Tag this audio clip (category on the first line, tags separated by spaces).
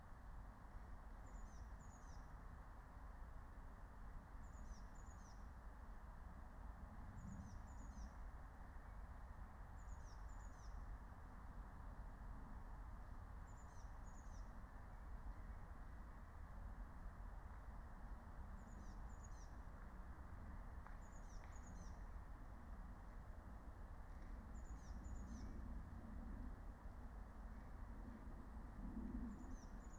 Soundscapes > Nature
meadow soundscape phenological-recording alice-holt-forest nature field-recording natural-soundscape raspberry-pi